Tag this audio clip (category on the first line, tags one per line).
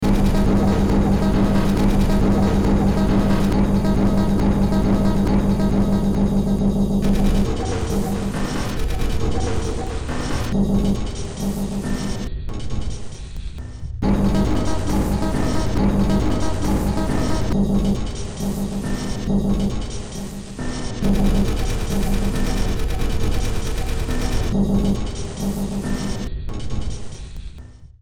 Music > Multiple instruments
Cyberpunk
Noise
Sci-fi
Games
Horror
Ambient
Underground
Soundtrack
Industrial